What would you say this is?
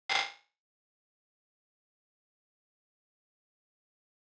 Sound effects > Electronic / Design
Password Fail (Disinterested Static)
glitches, password-incorrect, wrong-code